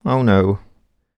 Speech > Solo speech
Sadness - Oh no
Mid-20s, Voice-acting, words, singletake, Single-take, Man, sad, dialogue, Video-game, Male, FR-AV2, Sadness, Neumann, U67, Vocal, voice, Tascam, NPC, Human, word, oneshot, talk